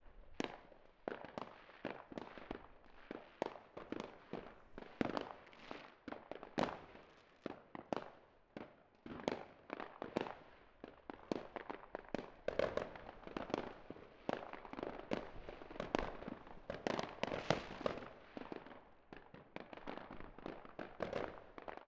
Sound effects > Natural elements and explosions
Fireworks Distant And Near Increasing To Full Display
Stereo field recording of distant and near fireworks at the beginning of a New Year’s Eve celebration. Individual explosions with natural spacing, recorded outdoors with wide stereo image. Suitable for film, game ambience, documentaries and sound design. Recorded using a stereo A/B setup with a matched pair of RØDE NT5 microphones fitted with NT45-O omni capsules, connected to an RME Babyface interface. Raw field recording with no post-processing (no EQ, compression or limiting applied).